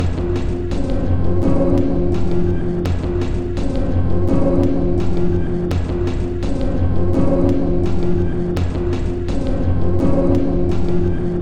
Instrument samples > Percussion
This 168bpm Drum Loop is good for composing Industrial/Electronic/Ambient songs or using as soundtrack to a sci-fi/suspense/horror indie game or short film.
Alien, Ambient, Dark, Drum, Industrial, Loop, Loopable, Packs, Samples, Soundtrack, Underground, Weird